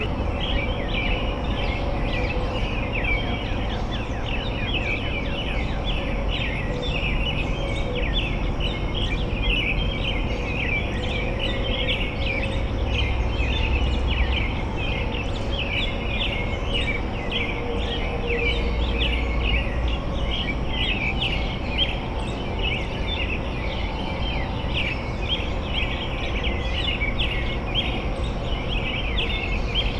Soundscapes > Urban
AMBSubn-Summer Early Morning in a Neighborhood Subdivision, distant interstate traffic, birdsong, garbage truck, 5AM QCF SPring Hill Tennessee Sony M10
Early Summer Morning in a Neighborhood Subdivision, 5AM, distant interstate traffic, birdsong
field-recording, residential, suburbia, subdivision, neighborhood, summer, morning, birdsong